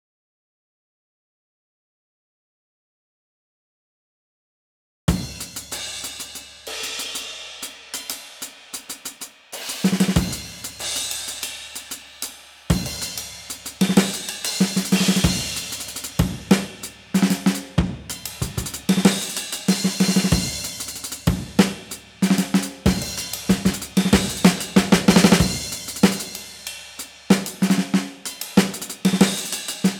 Instrument samples > Percussion
189 bpm - Drum Kit Jazz Rock Breakbeat
A 189 bpm drum groove from one of my tunes